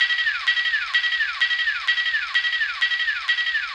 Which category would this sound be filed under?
Music > Other